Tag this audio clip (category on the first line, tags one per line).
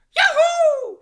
Sound effects > Human sounds and actions
excited
human
male
man
yell